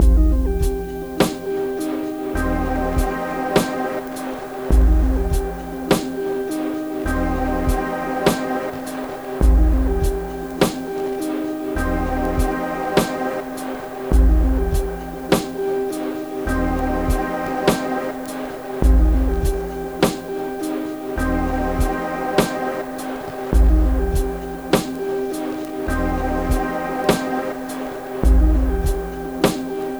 Music > Multiple instruments
Nostalgic electronic intro music
Music intro for shorts, podcats or games.
music, loop, intro, slow